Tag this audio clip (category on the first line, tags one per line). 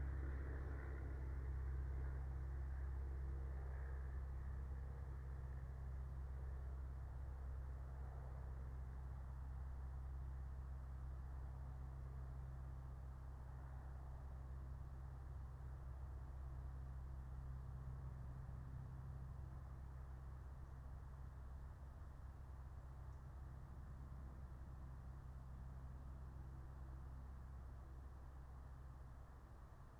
Soundscapes > Nature
nature
field-recording
raspberry-pi
natural-soundscape
phenological-recording
soundscape
alice-holt-forest
meadow